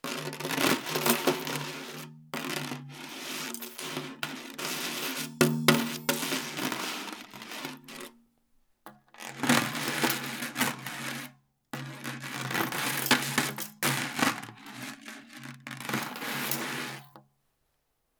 Music > Solo instrument
High Tom Sizzle Chain Perc Scrape FX
Cymbal, Perc, Percussion, GONG, Custom, Drums, FX, Kit, Oneshot, Paiste, Ride, Cymbals, Metal, Hat, Sabian, Crash, Drum